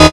Instrument samples > Synths / Electronic
DRILLBASS 8 Eb
additive-synthesis, fm-synthesis